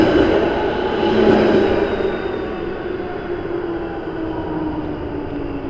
Sound effects > Vehicles
Tram00055972TramPassingBy
city, field-recording, tram, transportation, vehicle